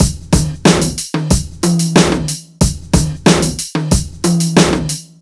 Music > Other
hip hop 11 drums 92 bpm
beat, breakbeat, hiphop, loop, percussion-loop